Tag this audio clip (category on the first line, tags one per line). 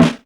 Music > Other
1-shot
drum
snare